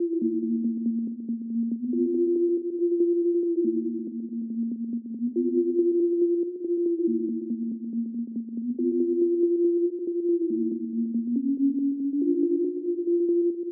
Music > Solo instrument
KEEM 140BPM (prod.